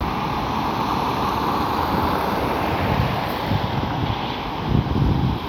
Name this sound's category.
Soundscapes > Urban